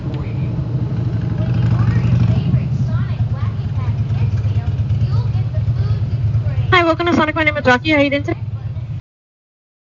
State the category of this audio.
Sound effects > Vehicles